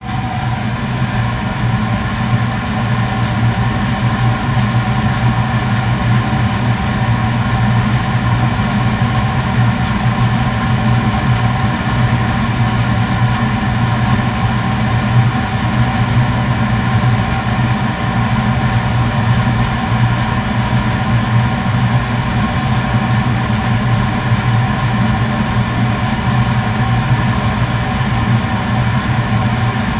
Sound effects > Objects / House appliances
Organ Blower in Closet
Recording of organ blower in organ practice room at the University of Michigan School of Music, Theatre & Dance. Recorded on ledge with closet door closed. Recorded September 2, 2025.
air, closet, organ